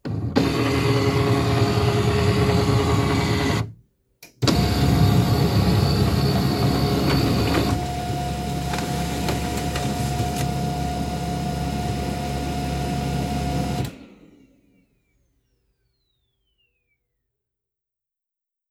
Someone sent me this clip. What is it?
Sound effects > Objects / House appliances
A scanner scanning copy and printing out paper.